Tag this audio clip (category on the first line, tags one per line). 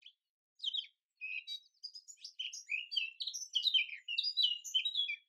Sound effects > Animals
Bird
birds
blackcap
chirp
field-recording
morning
nature
songbird